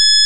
Sound effects > Vehicles
Pickup Dropoff Capture
Bus, Transport, Ride